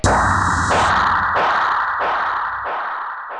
Sound effects > Electronic / Design
Impact Percs with Bass and fx-020
bass, cinamatic, combination, foreboding, explosion, brooding, mulit, fx, smash, perc, theatrical, bash, impact, hit, sfx, explode, ominous, deep, crunch, looming, percussion, low, oneshot